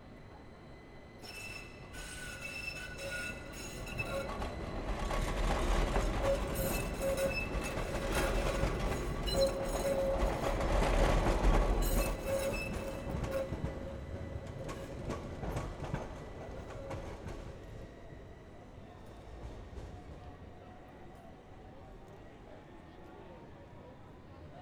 Sound effects > Vehicles
Tram screeching near Ekedal, Gothenburg, recorded with a Zoom H5.